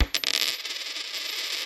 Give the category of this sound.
Sound effects > Objects / House appliances